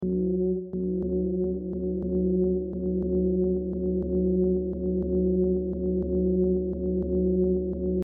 Sound effects > Electronic / Design
Sounds dystopian, like something from Half-Life 2.